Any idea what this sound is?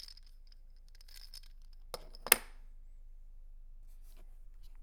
Sound effects > Objects / House appliances
cap folley 3
jostling caps around recorded with tasam field recorder
cap, delicate, foley, metal, sfx, small, tap, taps, tink